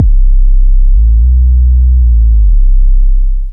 Instrument samples > Synths / Electronic
CVLT BASS 176
bass, bassdrop, clear, drops, lfo, low, lowend, stabs, sub, subbass, subs, subwoofer, synth, synthbass, wavetable, wobble